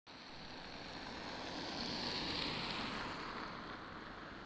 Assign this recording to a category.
Sound effects > Vehicles